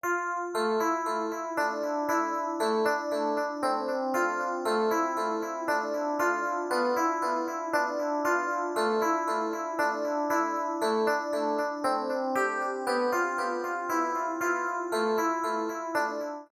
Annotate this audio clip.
Instrument samples > Piano / Keyboard instruments
Electric Delayed 116BPM
Don't know what to do with this arp type melody, created it long ago, so uploading here.